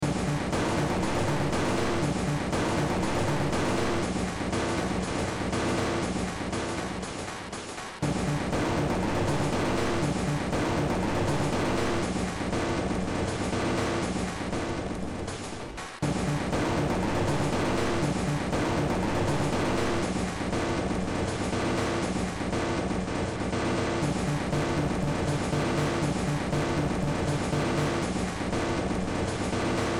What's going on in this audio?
Music > Multiple instruments
Short Track #3392 (Industraumatic)
Industrial, Soundtrack, Horror, Underground, Cyberpunk, Noise, Games, Sci-fi, Ambient